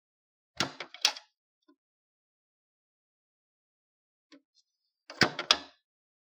Sound effects > Objects / House appliances
Closing and opening the audio cassette compartment
Opening and closing the cassette compartment on a Samsung music center. Recorded on galaxy grand prime.